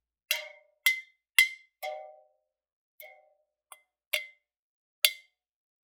Sound effects > Objects / House appliances
aluminum can foley-023
alumminum, fx, household, sfx, tap, water